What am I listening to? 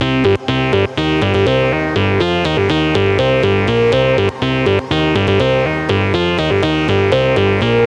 Music > Solo instrument
122 A# MC202Monotribe 02
Synth/bass loops made with Roland MC-202 analog synth (1983)